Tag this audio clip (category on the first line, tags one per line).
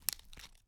Sound effects > Experimental
bones
foley
onion
punch
thud
vegetable